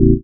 Instrument samples > Synths / Electronic
WHYBASS 4 Bb
bass, additive-synthesis, fm-synthesis